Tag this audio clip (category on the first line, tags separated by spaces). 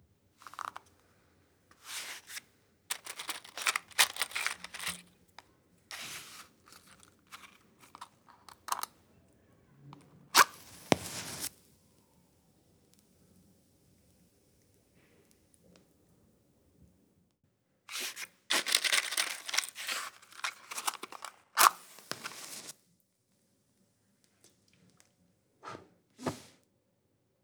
Sound effects > Objects / House appliances
open prender fire match encender